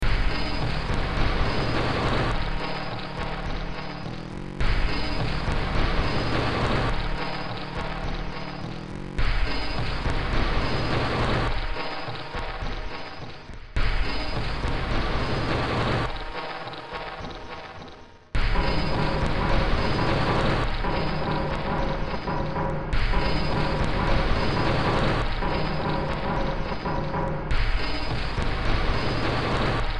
Music > Multiple instruments
Demo Track #3708 (Industraumatic)
Sci-fi, Horror, Noise, Soundtrack, Ambient, Games, Cyberpunk, Underground, Industrial